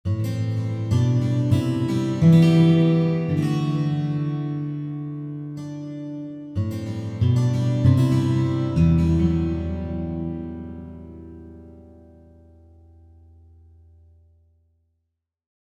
Music > Solo instrument
Reflective Guitar Chords #1
solo-guitar, guitar-chords, reflective-guitar, soulful-guitar, soft-guitar, guitar-transition